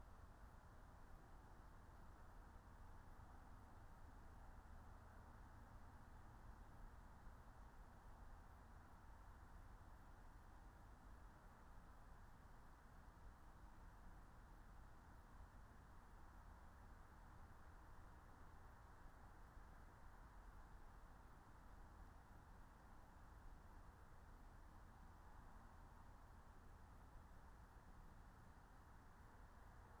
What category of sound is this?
Soundscapes > Nature